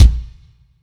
Instrument samples > Percussion
kick simple 2022 1
Not a good kick. A trembling attack/ shivering attack (not clearly double attack) kick. It's needlessly noisy. I used WaveLab 11. • I boosted the 23 ㎐ and the 57 ㎐ with very slightly wide notch filters. • I repeated a sinelike attack region but I deleted part of the second semiperiod (second wave half) and I redrew it smoothly mimicking the sounds texture. Please remind me to upload it.)
bass, percussion, beat, shivering-attack, drum, trembling-attack, kick, death-metal, rock, thrash-metal, drums, mainkick, groovy, bassdrum, rhythm, double-attack, metal, thrash, bass-drum, hit